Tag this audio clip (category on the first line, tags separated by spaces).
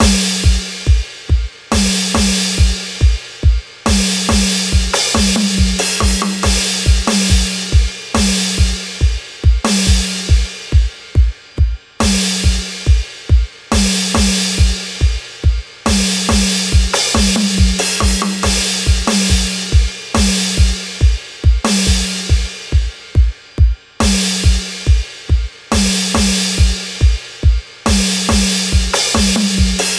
Music > Multiple instruments
Beats Funny Drum